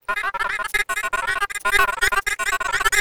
Speech > Other
Pixely Ringtone
I was trying to make a ringtone for my game using my own speech, but I wanted to make it sound pixely and 8-bity so I sped it up a lot and give it the "Robot" effect on Turbowarp and I accidentally made this
8bit, ringtone, robot, video-game